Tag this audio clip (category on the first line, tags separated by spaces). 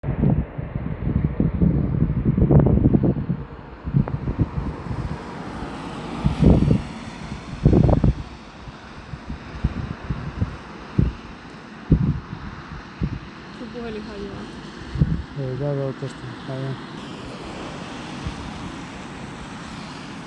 Soundscapes > Urban
city driving car tyres